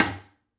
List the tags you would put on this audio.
Instrument samples > Percussion
percussive
hit
percussion
drum

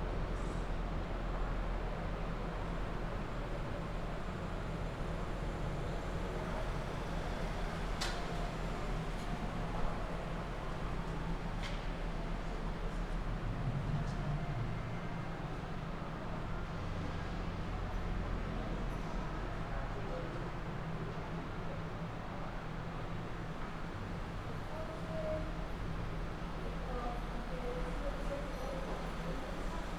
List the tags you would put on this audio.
Soundscapes > Indoors
Zealand
Garage
H5
Invercargill

